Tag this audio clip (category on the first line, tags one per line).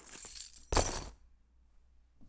Sound effects > Objects / House appliances
clunk
drill
fx
mechanical
perc
sfx